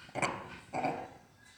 Sound effects > Animals
Primates - Vervet Monkey
A vervet, a type of African monkey, vocalizes at Hope Ranch Zoo in Guyton, GA. Recorded with an LG Stylus 2022. Fun Fact: Steve the monkey of Cloudy with a Chance of Meatballs franchise is this kind of Old World monkey.
chatter, monkey, jungle, safari, vervet, africa, primate, savanna, zoo